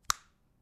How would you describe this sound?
Sound effects > Other
menu target
50 - Switching between targets/menu options Foleyed with a H6 Zoom Recorder, edited in ProTools
menu, ui, targetting